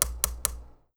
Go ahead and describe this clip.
Sound effects > Objects / House appliances
FOLYProp-Blue Snowball Microphone, CU Baton, Tapping 02 Nicholas Judy TDC
A baton tapping.